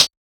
Instrument samples > Percussion
8 bit-Noise Snare Stick
8-bit, percussion, FX, game